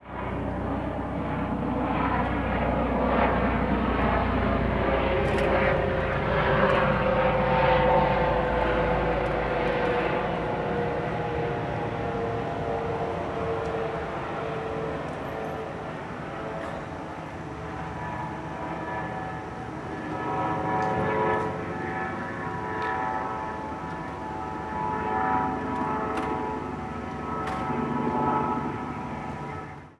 Soundscapes > Urban
Splott - Helicopter Flyby - Cameron Street
fieldrecording, wales, splott